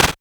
Instrument samples > Percussion
8 bit-Noise Percussion2
FX, game, 8-bit